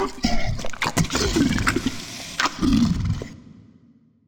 Sound effects > Experimental
Creature Monster Alien Vocal FX (part 2)-006
weird, Sfx, otherworldly, Creature, demon, growl, gross, devil, snarl, Monster, Alien, bite, grotesque, mouth, dripping, fx, zombie, howl